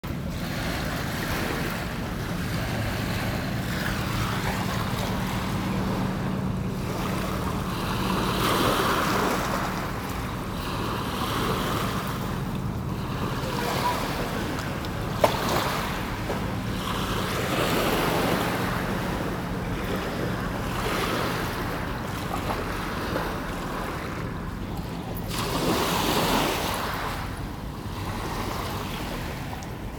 Soundscapes > Nature
Waves on a Beach (distant talking)
the waves of water lapping at a small Thames beach in London
beach, lapping, london, ocean, sea, seaside, shore, wave, waves